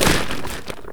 Sound effects > Other
Mud Splash
A singular splash sound effect that sounds like mud or something similar. I made it by shaking a carton of coffee creamer and then layering it. I used a Blue Yeti to record it.
splash, muddy, mud